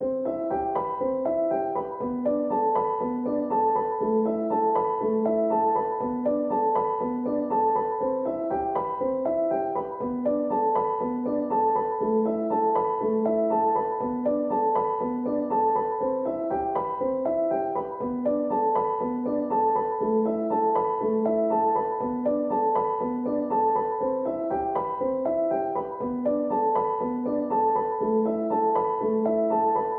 Music > Solo instrument
Piano loops 192 octave long loop 120 bpm
simplesamples, music, samples, free, loop